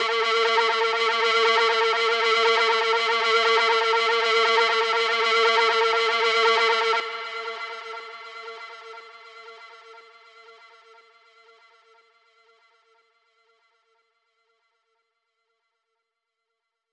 Speech > Other
sonar sound effect HELL SCREAM YELL
Man child screaming. Sound is a recording of vocals in my home studio.